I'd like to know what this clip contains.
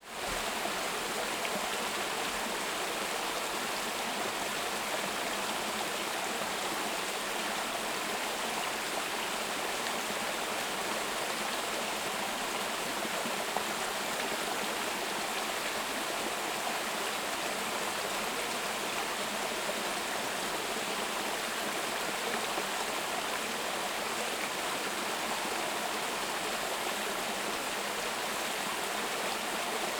Soundscapes > Nature
riverbank river flow
I recorded this sound by a riverside in France, in the early evening of a summer’s day. The microphone is ZOOM H6.
flow, river, water